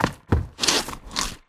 Sound effects > Human sounds and actions
Recording of a Ledge Climb (climbing to a concrete roof from a wall jump)
I climbed onto a small concrete roof and recorded it with a Portacapture X8.
SFX, Ledge, Sound